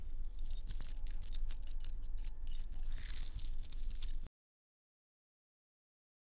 Soundscapes > Other
chain rustling in my hand to imitate keys
chain, jewlery, keyring, keys